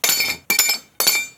Sound effects > Objects / House appliances
Dropping a pipe wrench onto the floor, three times. Recorded with my phone.
clank, drop, tools